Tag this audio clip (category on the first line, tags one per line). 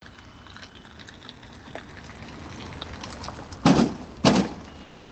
Sound effects > Vehicles
car
clang
loose
metal
slow